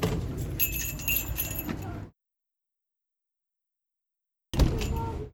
Sound effects > Objects / House appliances
DOORWood-Samsung Galaxy Smartphone, CU Shop, Open, Close, Bells Jingle Nicholas Judy TDC
A shop door opening and closing with bells jingling. Recorded at The Local Cup.